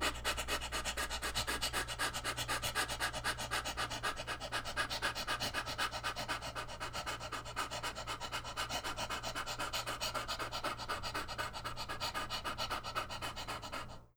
Sound effects > Human sounds and actions
HMNBrth-Blue Snowball Microphone Panting, Fast Nicholas Judy TDC
Blue-brand, Blue-Snowball, breath, fast, human, pant